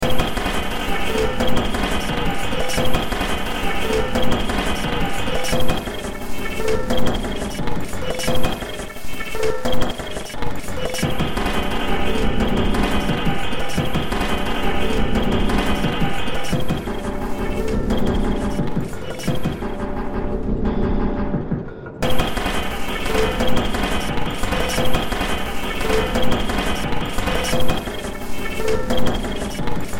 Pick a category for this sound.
Music > Multiple instruments